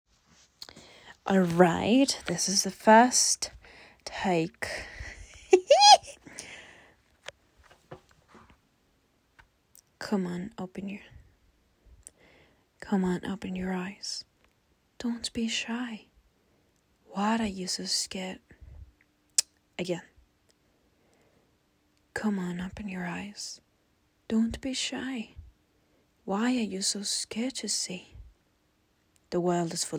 Speech > Solo speech
Female voice poem
A poem i wrote
speech, english, vocal, poem, woman, voice, female